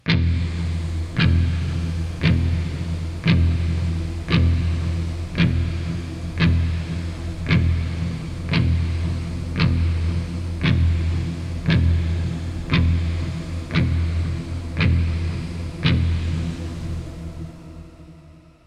Music > Solo instrument
Suspense marching on guitar 60bpm
This was made on Guitar Fender ... Palm muted in E . A lush, atmospheric shimmer reverb effect, perfect for creating ethereal pads, dreamy soundscapes, or adding an angelic tail to lead instruments. This effect pitches up the reverb decay, generating bright, sustained harmonics that float above the original signal, producing a rich, evolving, and slightly otherworldly ambiance. Captured using AmpliTube 5's Shimmer Reverb. Ideal for ambient music, film scores, game audio, or experimental sound design.
creepy, guitar, horror, march, terror